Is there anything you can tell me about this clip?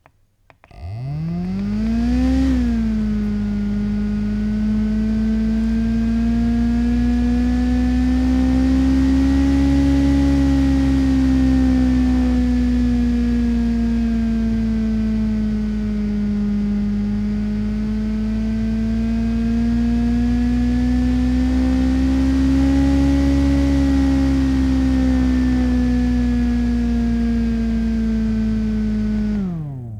Sound effects > Other mechanisms, engines, machines
Personal battery powered fan - 2
Subject : A small personal usb c battery powered fan. 4 Bladed about 5cm blade to blade. Date YMD : 2025 July 23 Early morning. Location : France indoors. Sennheiser MKE600 with stock windcover P48, no filter. Weather : Processing : Trimmed and normalised in Audacity.